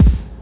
Instrument samples > Synths / Electronic
Sounds made with The Bleep Drum, an Arduino based lo-fi rad-fi drum machine